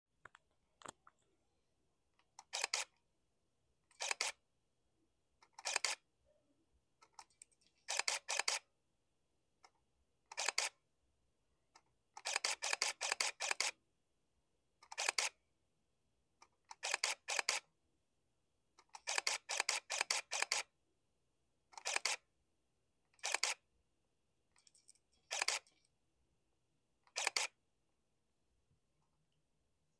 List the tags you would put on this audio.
Sound effects > Electronic / Design
professional 2012 camera shutter photography nikon

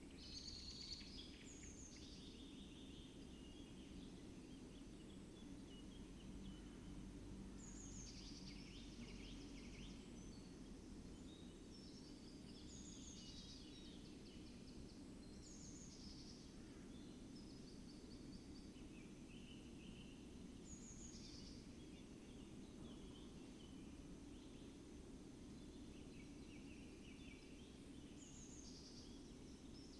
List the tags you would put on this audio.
Soundscapes > Nature
nature sound-installation phenological-recording raspberry-pi weather-data Dendrophone alice-holt-forest artistic-intervention data-to-sound modified-soundscape field-recording natural-soundscape soundscape

